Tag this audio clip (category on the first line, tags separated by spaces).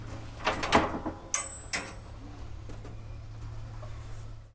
Sound effects > Objects / House appliances
Ambience Atmosphere Bash Clang Clank dumping Environment Foley FX garbage Junk Junkyard Metal Metallic Perc Percussion rattle Robot Robotic SFX trash tube waste